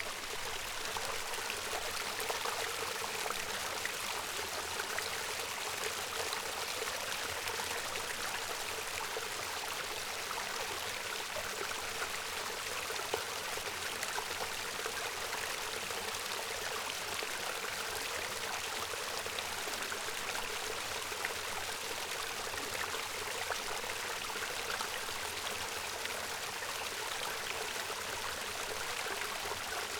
Sound effects > Natural elements and explosions
Creek in the mountains
A stream in the mountains of Crimea Recorded using Tascam Portacapture X8
creek, river, stream, water